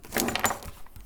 Sound effects > Other mechanisms, engines, machines
metal shop foley -150
bang, wood, rustle, pop, tink, strike, crackle, sfx, bop, bam, shop, knock, sound, oneshot, fx, boom, little, thud, perc, percussion, tools, foley, metal